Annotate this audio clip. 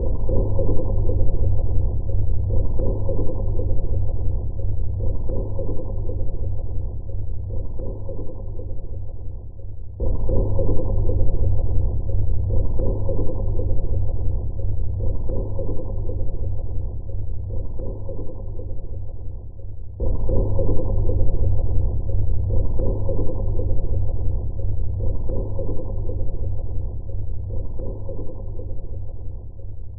Instrument samples > Percussion
This 48bpm Horror Loop is good for composing Industrial/Electronic/Ambient songs or using as soundtrack to a sci-fi/suspense/horror indie game or short film.
Packs, Ambient, Dark, Alien, Underground, Weird, Soundtrack, Industrial, Drum, Samples, Loopable, Loop